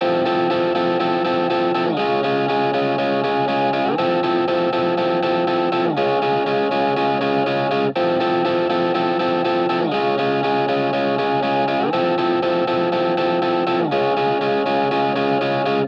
Music > Solo instrument
Guitar loops 124 05 verison 05 120.8 bpm
electricguitar, samples, free, music, bpm, reverb, loop, guitar, electric, simplesamples, simple